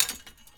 Sound effects > Other mechanisms, engines, machines
metal shop foley -184
tools, boom, wood, sfx, crackle, little, shop, bop, perc, pop